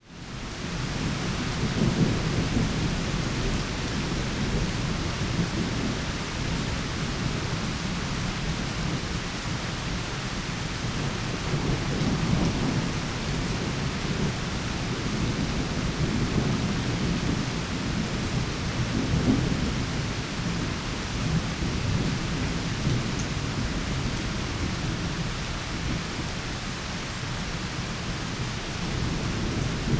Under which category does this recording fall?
Soundscapes > Urban